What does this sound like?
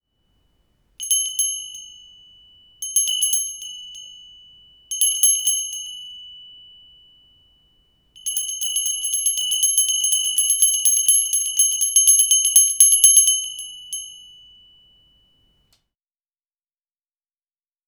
Sound effects > Other
bell small2
another small bell ringing
bell, ring, tingle, chime